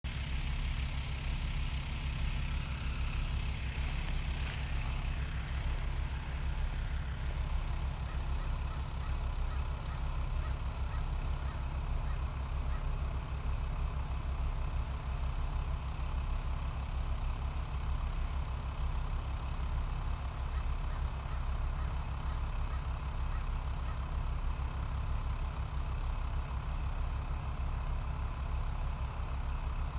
Soundscapes > Nature
Attempt to drill for new water well next door